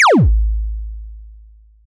Sound effects > Electronic / Design
Medium laser shoot
Can be used in retro games (shooting, like in space invaders)
Game, SFX, Sounds, 8bit